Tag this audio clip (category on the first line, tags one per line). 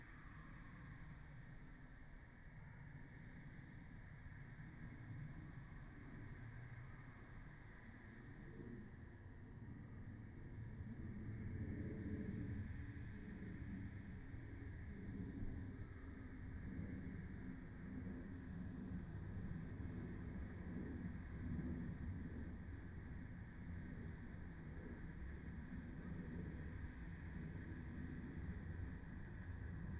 Soundscapes > Nature
modified-soundscape
data-to-sound
sound-installation
nature
alice-holt-forest
field-recording
Dendrophone
raspberry-pi
weather-data
phenological-recording
natural-soundscape
artistic-intervention
soundscape